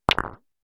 Sound effects > Other mechanisms, engines, machines
Lawn Bowl-Single-Contact-02
Third of a four part set of single contacts. This one is harder and sharper. (actually Billiard Ball is included.) It might include "The Kitty" in the contact. A Kitty is the smaller white ball that is the "target" for the set.
Wooden, Contact, Bowl, LawnBowls, Balls, Sport